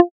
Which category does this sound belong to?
Instrument samples > Synths / Electronic